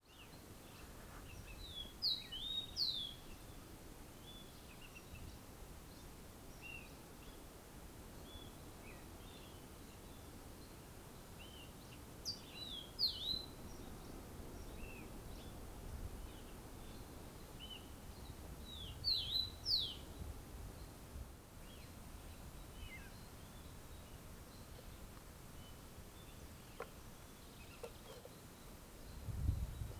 Soundscapes > Nature
Soundscape of the wetland El Yeco in Chile, Mirasol Beach. Recorded with: Tascam DR100 MK-II , Built in stereo microphones.